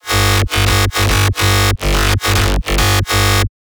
Music > Solo instrument
Dubstep Growl 1-140 bpm
Synthed with phaseplant only.
140bpm, Bass, Dubstep, Growl, Loop